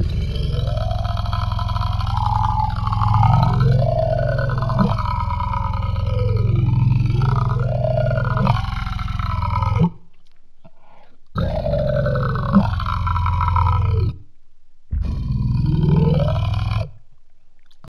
Sound effects > Experimental
Creature Monster Alien Vocal FX-18
Otherworldly, gamedesign, Vocal, Snarl, Alien, Growl, Deep, Snarling, scary, gutteral, devil, Groan, fx, Fantasy, Creature, demon, Monstrous, boss, Monster, Sound, evil, Frightening, Animal, Reverberating, Echo, Ominous, Vox, sfx, Sounddesign, visceral